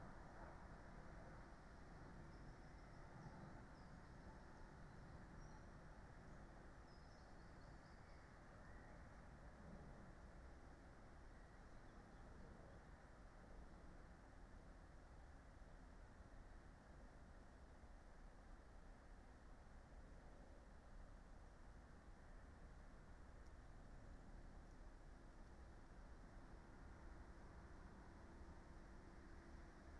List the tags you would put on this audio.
Soundscapes > Nature
Dendrophone artistic-intervention raspberry-pi modified-soundscape alice-holt-forest soundscape data-to-sound nature natural-soundscape weather-data phenological-recording field-recording sound-installation

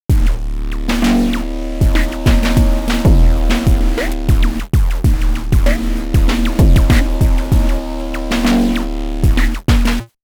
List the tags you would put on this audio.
Music > Multiple instruments
industrial wave glitchy hip edm idm new melodies percussion loops patterns bass drumloop beats hop melody

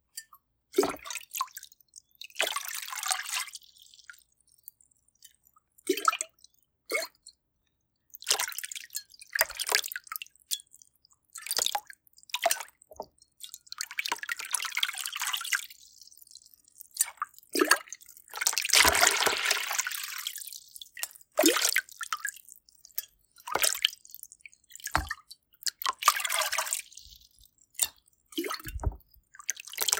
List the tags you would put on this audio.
Sound effects > Objects / House appliances
caraf pour gurgle pitcher dishwasher kitchen sink pot bowl empty bath pots crockery tableware emptying dishes bathroom container filling washing jug fill basin water wash splash glass dish pouring liquid

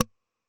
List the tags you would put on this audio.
Sound effects > Electronic / Design
game ui

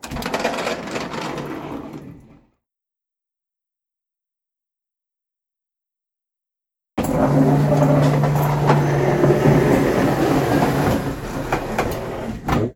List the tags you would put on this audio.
Sound effects > Other mechanisms, engines, machines
door automatic close open

